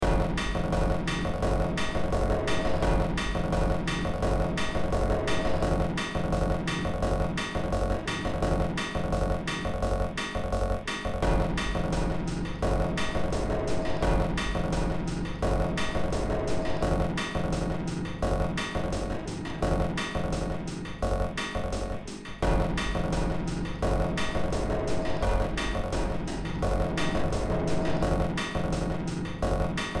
Music > Multiple instruments
Short Track #3195 (Industraumatic)
Ambient, Cyberpunk, Games, Industrial, Noise, Soundtrack, Underground